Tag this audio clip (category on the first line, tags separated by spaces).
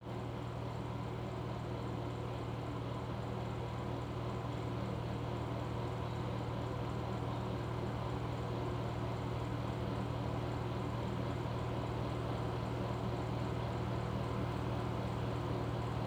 Sound effects > Objects / House appliances
calefactor household pinknoise quietBarcelona space-heater spaceheater